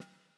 Music > Solo percussion
Snare Processed - Oneshot 70 - 14 by 6.5 inch Brass Ludwig
realdrums, fx, realdrum, kit, acoustic, rimshot, percussion, snares, rimshots, snareroll, sfx, ludwig, drumkit, oneshot, beat, snare, hits, roll, rim, snaredrum, crack, processed, drums, perc, drum, brass, hit, reverb, flam